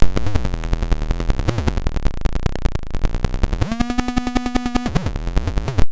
Sound effects > Electronic / Design
Optical Theremin 6 Osc dry-039
Alien, Analog, Digital, Dub, Experimental, FX, Glitch, Glitchy, Handmadeelectronic, Infiltrator, Instrument, Noise, noisey, Optical, Robot, Sci-fi, Scifi, SFX, Synth